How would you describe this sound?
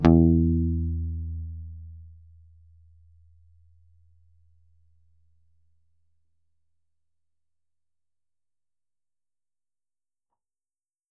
Instrument samples > String
E2 - Bass Guitar Palm Muted
E2 note picked and palm muted on a Squire Strat converted Bass. Static reduced with Audacity.